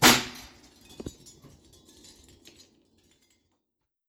Sound effects > Objects / House appliances
GLASBrk Lightbulb Pop, Shatter, Simulated Nicholas Judy TDC
A lightbulb popping and shattering. Simulated.
Phone-recording, pop, shatter